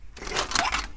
Sound effects > Other mechanisms, engines, machines
metal shop foley -098
a collection of foley and perc oneshots and sfx recorded in my workshop
bam, bang, boom, bop, crackle, foley, fx, knock, little, metal, oneshot, perc, percussion, pop, rustle, sfx, shop, sound, strike, thud, tink, tools, wood